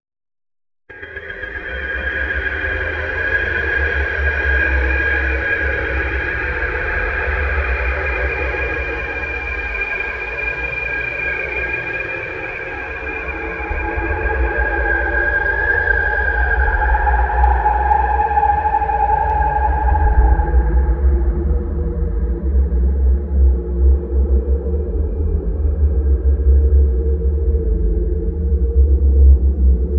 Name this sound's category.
Soundscapes > Synthetic / Artificial